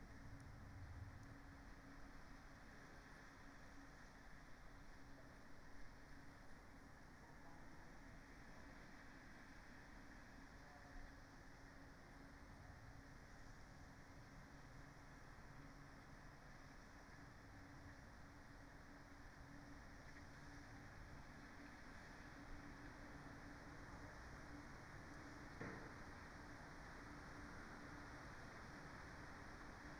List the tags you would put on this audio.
Soundscapes > Nature
data-to-sound modified-soundscape phenological-recording raspberry-pi soundscape sound-installation field-recording alice-holt-forest Dendrophone natural-soundscape nature artistic-intervention weather-data

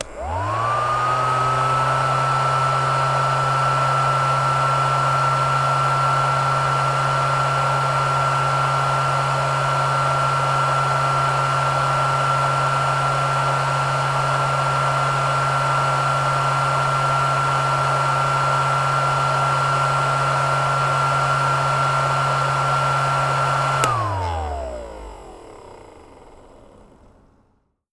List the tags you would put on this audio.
Sound effects > Other mechanisms, engines, machines
Blue-brand Blue-Snowball fan hover machine run soccer-ball turn-off turn-on

